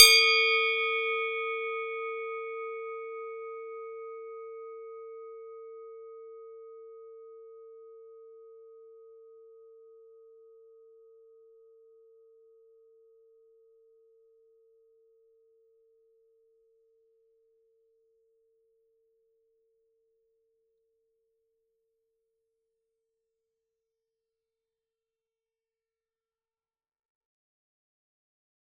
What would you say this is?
Instrument samples > Percussion
Swiss cowbell 14Wx11Hcm - 7cm away
Subject : A cowbell (actual bell not the instrument) 14cm large by 11cm high. Recorded 7CM away. Date YMD : 2025 04 21 Location : Gergueil France. Hardware : Tascam FR-AV2 Rode NT5 microphones. Weather : Processing : Trimmed and Normalized in Audacity. Probably some Fade in/outs too.
bell, cowbell, ding, FR-AV2, NT5, one-shot, oneshot, Rode, swiss-cowbell